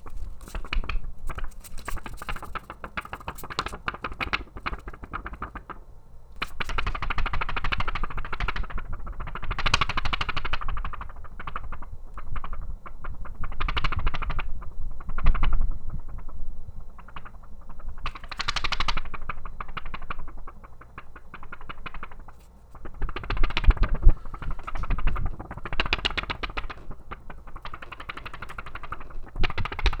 Sound effects > Objects / House appliances
Comedic wobbling. Anime.